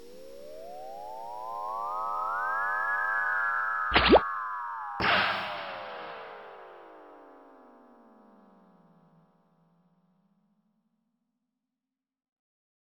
Music > Other
Fade-in rising reverberant synth tone with "fwoop" and reverberating laser - fade-out